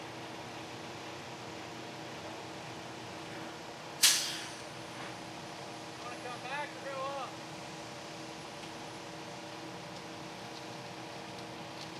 Sound effects > Vehicles
VEHCnst Street Construction Cement Mixer Truck Air Brake Usi Pro AB RambleRecordings 003
This is the sound of a cement mixer's after parking to pour cement for street repair. I've included some ambience from the construction area. At #0:06 you can hear one of the workers mention something to the driver. This was recorded in downtown Kansas City, Missouri in early September around 13:00h. This was recorded on a Sony PCM A-10. My mics are a pair of Uši Pros, mounted on a stereo bar in an AB configuration on a small tripod. The mics were placed in an open window facing the street where some road construction was happening. The audio was lightly processed in Logic Pro, The weather was in the low 20s celsius, dry, and clear.
air-brake, construction, cement, vehicle, concrete, site, air, construction-site, cement-mixer, hiss